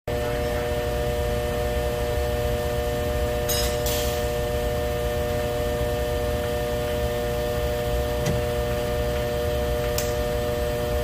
Sound effects > Other mechanisms, engines, machines
Machine Hum & Click SFX
This sound captures the ambient hum of a motor running and a machine making clcks.
Click, Factorynoise, Macine, Motor